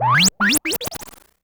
Sound effects > Experimental
Analog Bass, Sweeps, and FX-193
alien, analog, analogue, bass, basses, bassy, complex, dark, effect, electro, electronic, fx, machine, mechanical, oneshot, pad, retro, robot, robotic, sci-fi, scifi, snythesizer, vintage, weird